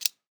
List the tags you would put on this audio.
Sound effects > Human sounds and actions
off
click
activation
toggle
button
interface
switch

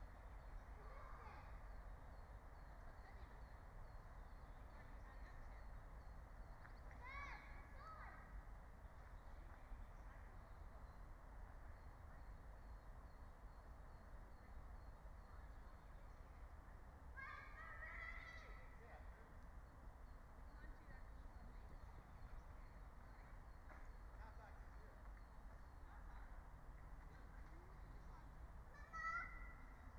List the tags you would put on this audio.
Soundscapes > Nature
natural-soundscape
raspberry-pi
soundscape
nature
field-recording
phenological-recording
alice-holt-forest

